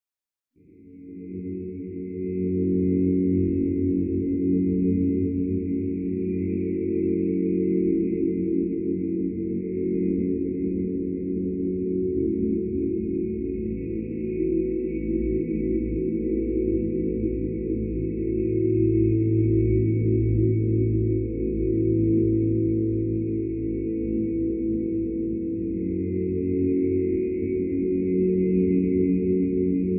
Sound effects > Electronic / Design
Dark Ambient Reverse Chords
Experimented with a piano chords sample; stretching it out, reversing it, and layering the sound with a lowpass filter, prominent reverb and compression, phase modulation and frequency modulation. This would be suited for media related to Horror/Thriller.
ambient, atmosphere, botanica, chords, cinematic, dark, grim, low, moody, piano, reverb, sad, spooky